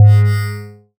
Instrument samples > Synths / Electronic
BUZZBASS 1 Ab
additive-synthesis, bass, fm-synthesis